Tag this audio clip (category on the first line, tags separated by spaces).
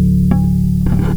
Instrument samples > String
bass electric fx loop mellow oneshots pluck plucked riffs rock slide